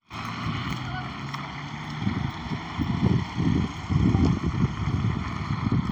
Sound effects > Vehicles
Sound of a car passing by in wet, cool and windy weather, with winter tires on the car. Recorded using a mobile phone microphone, Motorola Moto G73. Recording location: Hervanta, Finland. Recorded for a project assignment in a sound processing course.